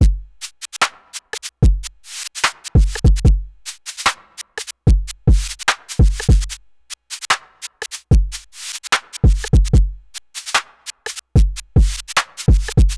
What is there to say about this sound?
Music > Solo instrument
All sounds from analogRTYM MK1 at 74BPM The kit was made using kick, clap, snare and woodblocks. The hi hat sound was made using the noise generator machine on the hi hat channel of the analogRTYM. The patterns were programmed in the analogRTYM, triggered via octatrack and recorded in a flex track inside the Octatrack MK2 with little to no processing
drummachine, clap, Elektron, 808, FOOTWORK, Crisp, drum